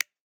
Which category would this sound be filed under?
Sound effects > Human sounds and actions